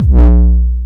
Instrument samples > Percussion
Old School Kick 1 140 bpm
Sample used from FLstudio original sample pack only. Plugin used: ZL EQ, Waveshaper.
Distorted, Gabbar, Hardstyle, kick, Oldschool